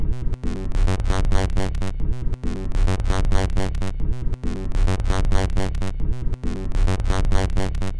Instrument samples > Percussion
This 120bpm Drum Loop is good for composing Industrial/Electronic/Ambient songs or using as soundtrack to a sci-fi/suspense/horror indie game or short film.
Packs, Samples, Loop, Weird, Loopable, Dark, Alien, Ambient, Soundtrack, Underground, Drum, Industrial